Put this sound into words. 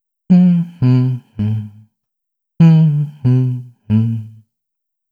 Sound effects > Human sounds and actions
Me, just buzzing around.
buzzing, just, Me